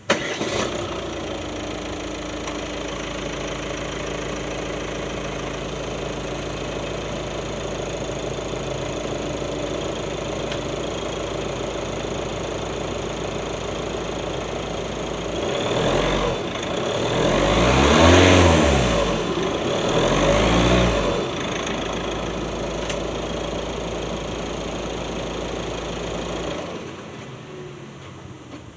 Sound effects > Vehicles
2022 Peugeot 3008 1.5L BlueHDi diesel engine starts, idles, revs to about ~3000 RPM and shuts off. Recorded from my phone with the Dolby On app, front of vehicle near hood (hood closed, Dolby enhancement turned off in this recording because it made the audio sound weird.) #0:15 - small rev (~1500 RPM) #0:17 - big rev (~3000 RPM) #0:20 - mid rev (~2000 RPM)